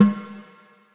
Music > Solo percussion
Snare Processed - Oneshot 125 - 14 by 6.5 inch Brass Ludwig
rimshots, flam, snares, brass, oneshot, perc, snare, realdrum, snareroll, realdrums, snaredrum, hit, drumkit, rimshot, drum, reverb, processed, rim, sfx, acoustic, percussion, kit, roll, crack, drums, ludwig, beat, fx, hits